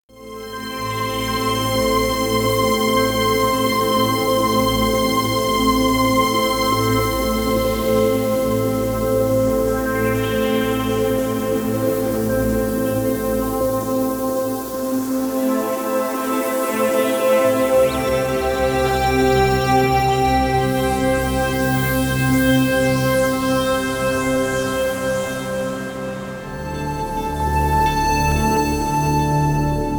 Soundscapes > Synthetic / Artificial
Ambient Autumn Morning with Coffee and Birds #001
Ambient relaxing soundscape improvised with Moodscaper on iPad. It’s relaxing, meditative and changing - but not too much. Recorded with AUM
Ambient, meditation, moodscaper, Newage, Dreamscape, relaxation, relax, relaxing, ipad, soundscape, meditative